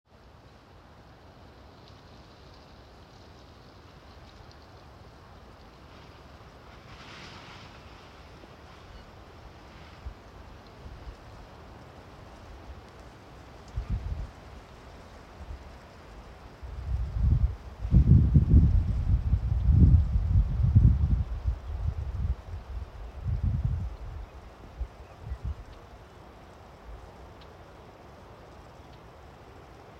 Soundscapes > Nature
Windy stormy atmosphere
atmosphere, country, farmlife, field-recordings, stormy, windy, winter